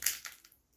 Sound effects > Objects / House appliances
FOLYMisc-Samsung Galaxy Smartphone, MCU Step On Thing, Crunch Nicholas Judy TDC
Stepping on a thing. Crunch.